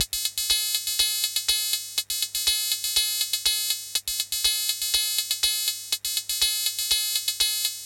Music > Solo percussion
122 606Mod-HH Loop 04
606, Analog, Bass, Drum, DrumMachine, Electronic, Kit, Loop, Mod, Modified, music, Synth, Vintage